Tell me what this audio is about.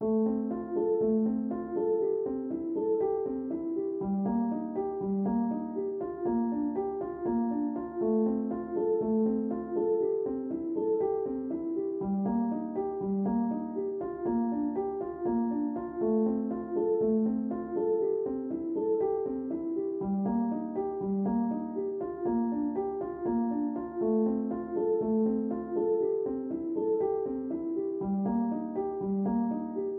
Music > Solo instrument
Piano loops 182 octave down long loop 120 bpm
loop, free, simplesamples, piano, pianomusic, music, 120, 120bpm, reverb, samples, simple